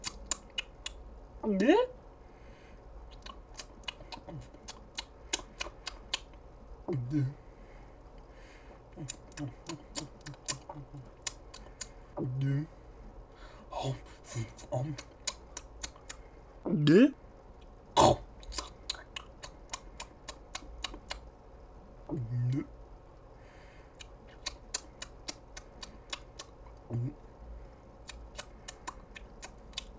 Sound effects > Human sounds and actions
TOONVox-CU Chewing, Gulping Nicholas Judy TDC
Cartoon chewing and gulping.
gulp, Blue-brand, cartoon, Blue-Snowball, chew